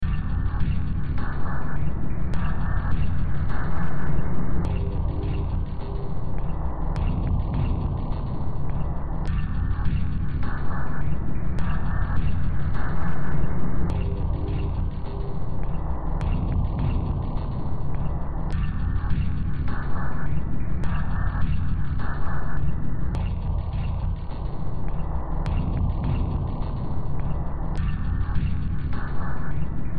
Music > Multiple instruments
Demo Track #2965 (Industraumatic)
Cyberpunk, Underground, Sci-fi, Ambient, Industrial, Games, Noise, Soundtrack, Horror